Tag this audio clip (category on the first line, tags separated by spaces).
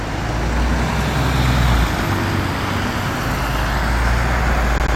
Soundscapes > Urban
transport bus